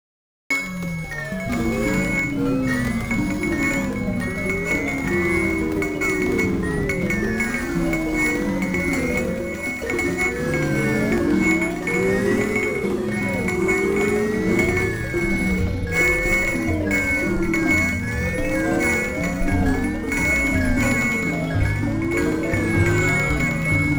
Soundscapes > Synthetic / Artificial
Grain Baby Mobile 1
effects, electronic, experimental, free, glitch, granulator, noise, packs, royalty, sample, samples, sfx, sound, soundscapes